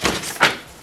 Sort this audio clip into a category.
Sound effects > Objects / House appliances